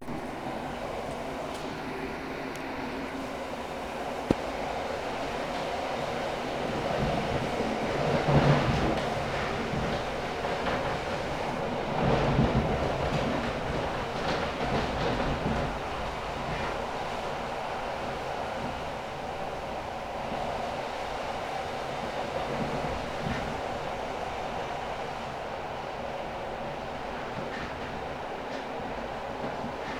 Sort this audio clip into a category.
Soundscapes > Indoors